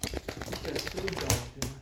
Sound effects > Animals
ANMLDog Mutt, Head Shake, Slobber Nicholas Judy TDC
A dog shaking it's head and slobbering. Performed by Jasper, the Judy family's dog. Voice calling him. Yawning at end.
head, head-shake, mutt, Phone-recording